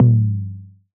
Instrument samples > Synths / Electronic
A tom one-shot made in Surge XT, using FM synthesis.
electronic, fm, surge, synthetic